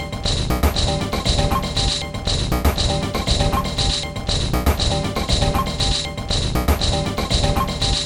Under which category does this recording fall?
Instrument samples > Percussion